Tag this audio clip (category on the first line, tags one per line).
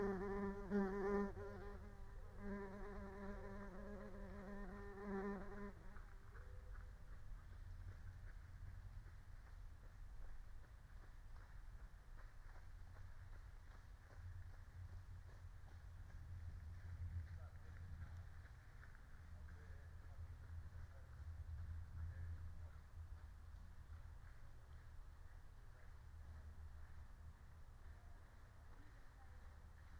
Soundscapes > Nature
alice-holt-forest
phenological-recording
soundscape
nature
natural-soundscape
meadow
raspberry-pi
field-recording